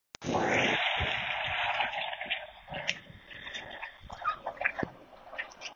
Soundscapes > Urban
Bus arriving 2 6
Where: Tampere Keskusta What: Sound of bus arriving at a bus stop Where: At a bus stop in the morning in a calm weather Method: Iphone 15 pro max voice recorder Purpose: Binary classification of sounds in an audio clip
bus, bus-stop, field-recording